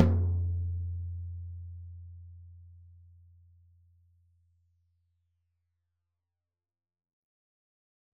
Music > Solo percussion
Floor Tom Oneshot -031 - 16 by 16 inch
floortom recording made in the campus recording studio of Calpoly Humboldt. Recorded with a Beta58 as well as SM57 in Logic and mixed and lightly processed in Reaper
beatloop, beats, drum, drumkit, fill, instrument, oneshot, perc, studio, tomdrum